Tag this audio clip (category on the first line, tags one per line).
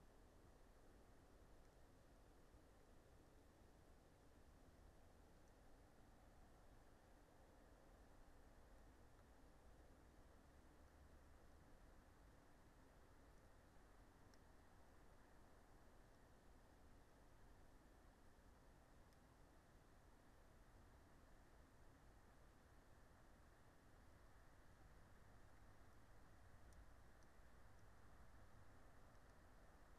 Soundscapes > Nature
alice-holt-forest
field-recording
meadow
natural-soundscape
nature
phenological-recording
raspberry-pi
soundscape